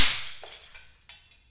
Sound effects > Natural elements and explosions
CD Shatter
CD bending then exploded.
break, break-glass, broken, broken-glass, explode, glass, Glass-break, glass-shatter, shatter, shatter-glass, shatterglass-smash, smash, smash-glass